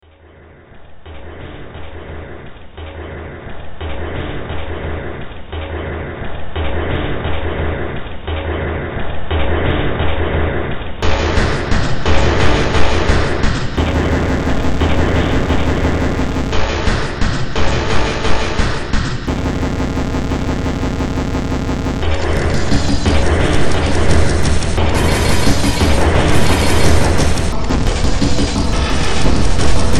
Music > Multiple instruments
Demo Track #3414 (Industraumatic)
Noise, Games, Sci-fi, Soundtrack, Industrial, Horror, Cyberpunk, Ambient, Underground